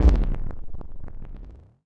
Sound effects > Experimental
Analog Bass, Sweeps, and FX-172
korg, alien, weird, synth, robotic, retro, bass, analog, oneshot, bassy, sfx, sci-fi, pad, analogue, sweep, machine, fx, scifi, snythesizer, robot, mechanical, dark, electro, trippy, basses, effect, complex, electronic, vintage, sample